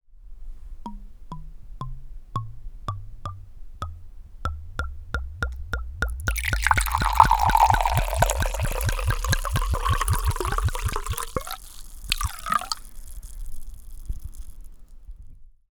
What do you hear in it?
Sound effects > Objects / House appliances
Close-up shot of a bottle pouring wine into a glass. Recorded with a Zoom H1essential
bottle, fill, glass, pour, pouring, wine